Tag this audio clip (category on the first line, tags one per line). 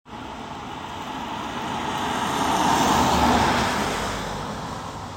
Sound effects > Vehicles
field-recording
car
tampere